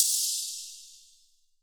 Instrument samples > Percussion
shimmer 2 kHz crash double high pass frequencies 2
THIS IS A CRASH TIMBRE FOR SOUNDBUILDING! • The shimmering (WaveLab 11 AutoPan 10 ㎐ [Hz]) starts after 25 ㎳ (ms/msec/milliseconds) because the attack must be strong.